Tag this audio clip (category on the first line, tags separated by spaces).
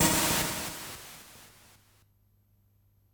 Sound effects > Electronic / Design
electricity analog 1lovewav electro 80s FX